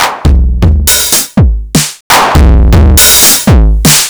Music > Solo percussion
Drums with distortion
Drums with and without distortion 120bpm